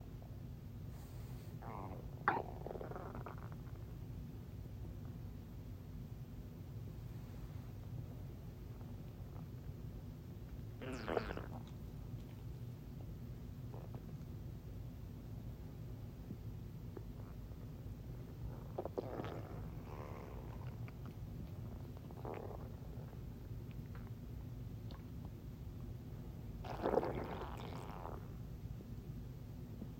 Sound effects > Animals
Dog Upset Stomach
The closeup sound of a dog's upset stomach gurgling.